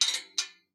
Sound effects > Other
A recording of me running my fingers across a metal gate.